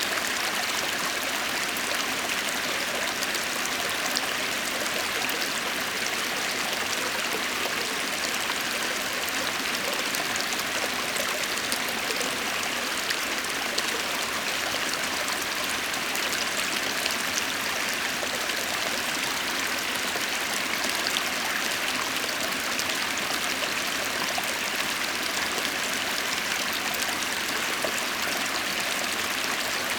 Soundscapes > Nature
Brook In The Park
A gentle stream, softly babbling over smooth stones, recorded with crystal-clear fidelity on the Sony A7 III. The sound is a peaceful and immersive whisper of nature, capturing every delicate splash and murmur with warm, detailed richness.
A7III, ambience, ASMR, audio, babbling, brook, calming, crisp, delicate, field, flow, gentle, high, immersive, murmur, natural, nature, peaceful, quality, recording, serene, Sony, soothing, sounds, soundscape, stream, water